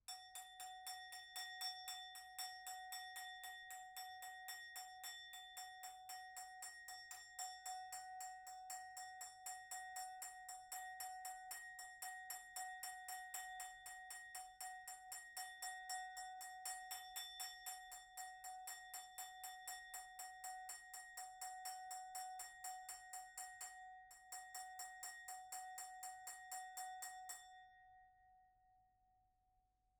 Sound effects > Other
Glass applause 11
A series of me recording multiple takes in a medium sized bedroom to fake a crowd. Clapping/talking and more original applause types, at different positions in the room. This glass applause series was done with different glasses and coffee spoons. With hindsight, I should have done some with water in them... Recorded with a Rode NT5 XY pair (next to the wall) and a Tascam FR-AV2. Kind of cringe by itself and unprocessed. But with multiple takes mixed it can fake a crowd. You will find most of the takes in the pack.